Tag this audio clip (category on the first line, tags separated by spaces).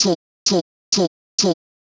Speech > Solo speech
One-shot BrazilFunk Vocal FX